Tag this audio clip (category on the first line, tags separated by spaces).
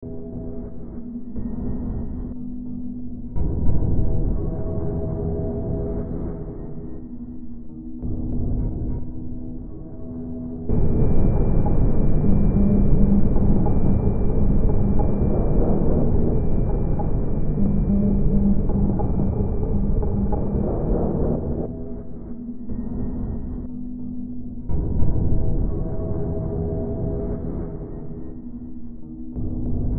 Soundscapes > Synthetic / Artificial
Darkness Horror Survival Sci-fi Underground Noise Gothic Games Ambient Ambience Hill Soundtrack Drone Silent Weird